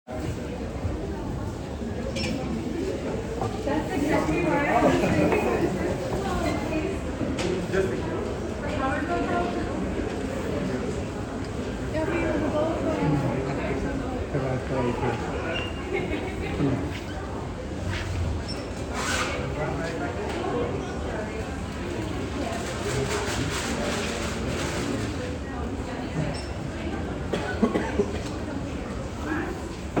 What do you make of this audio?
Soundscapes > Indoors
iPhone 6 stereo recording of Dutch walla in a busy bookshop. Cash register can be heard.